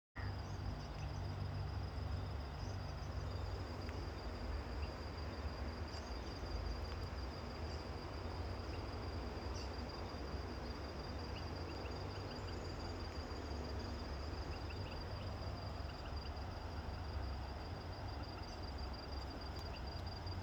Sound effects > Animals
peaceful atmosphere and animal sounds
animals, amazonia, birds, naturaleza, nature